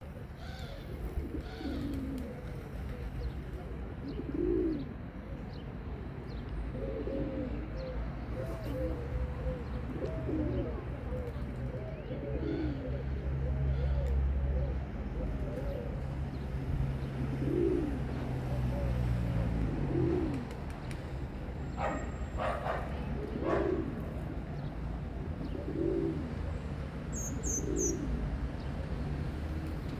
Soundscapes > Urban
Tarragona 13 May 2024 ext doves pigeons traffic
Sounds of pigeons or doves cooing, birds tweeting, distant traffic in Tarragona, Spain in 2024. Recording device: Samsung smartphone.
Tarragona, field-recording, birds, pigeons, doves, Spain, birds-cooing, distant-traffic